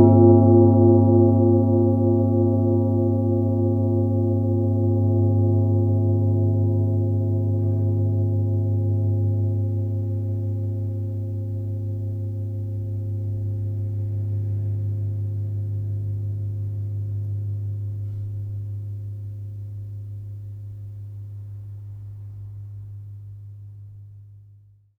Instrument samples > Other
tibetan bowls Recorded with sounddevices mixpre with usi microphones
bowls, tibet, tibetan